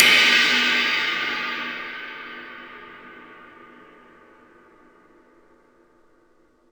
Instrument samples > Percussion
crash - very long 1
Avedis, bang, China, clash, crack, crash, crunch, Istanbul, Meinl, metal, metallic, multi-China, multicrash, polycrash, Sabian, shimmer, sinocymbal, smash, Zildjian, Zultan